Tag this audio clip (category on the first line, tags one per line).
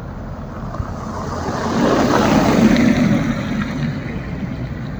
Sound effects > Vehicles
car
vehicle